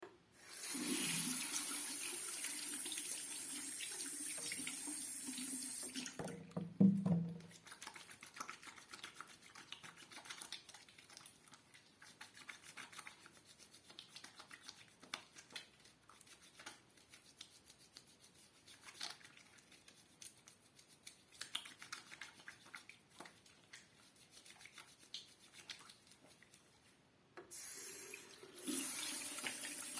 Sound effects > Human sounds and actions
Washing my hands with soap, lathering then rinsing with water
soapy, hands, wash, covid, sink, hygenic, rinse, health, hygene, water, bathroom, clean, hand, Washing, soap